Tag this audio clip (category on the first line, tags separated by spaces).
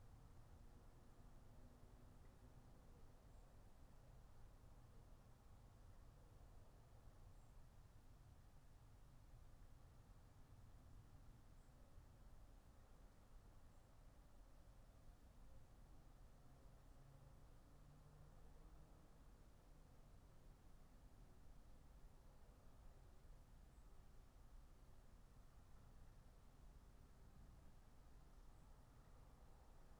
Soundscapes > Nature
Dendrophone sound-installation natural-soundscape phenological-recording nature weather-data raspberry-pi data-to-sound alice-holt-forest